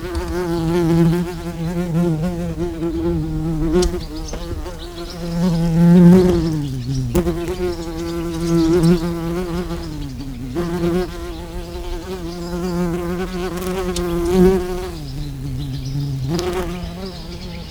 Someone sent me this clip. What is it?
Sound effects > Animals
250831 Gergueil Wasp
Subject : A wasp at the window. Date YMD : 2025 August 31 Location : Gergueil 21410 Bourgogne-Franche-Comté Côte-d'Or France. Sennheiser MKE600 with stock windcover. P48, no filter. A manfroto monopod was used. Weather : A little windy. Processing : Trimmed and normalised in Audacity.